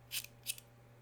Sound effects > Other
LIGHTER FLICK 10
flick, lighter